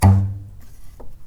Sound effects > Other mechanisms, engines, machines
Woodshop Foley-039
tools, strike, fx, bop, crackle, boom, percussion, rustle, pop, shop, little, bang, bam, foley, metal, thud, perc, knock, tink, oneshot, sound, wood, sfx